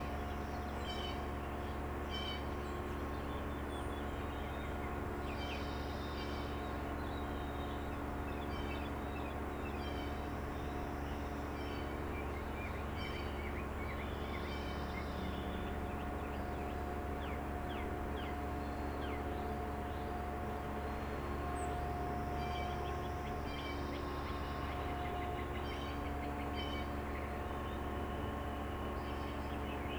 Soundscapes > Other
A Spring Day With Machinery and Birds In A Residential Neighborhood-002
This is part 2 of a 3 part field recording taken one spring day in a neighborhood. There was lots of machinery and birds. The first part feature heavy machinery. This second part features birds, a dog barking and chainsaw.